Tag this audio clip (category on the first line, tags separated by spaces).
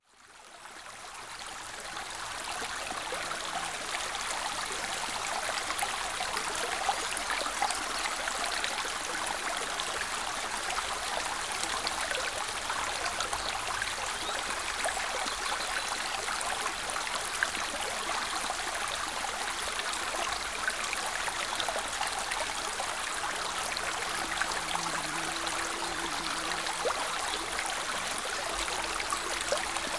Sound effects > Natural elements and explosions
natural river flowing